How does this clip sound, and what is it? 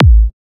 Instrument samples > Percussion
Psytrance Kick 02
145bpm, goa, goa-trance, kick, psy, psy-trance, psytrance, trance